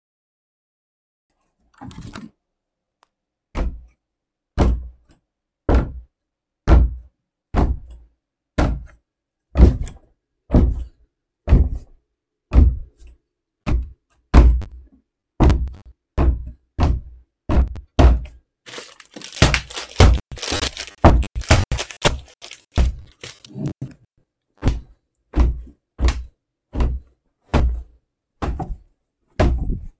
Sound effects > Human sounds and actions
Hit Wood Clothes
Sound recording of me hitting wood, clothes and various other things Thuds, hits, impact and so on
foley, hit, impact, punch, wood